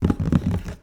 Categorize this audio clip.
Sound effects > Objects / House appliances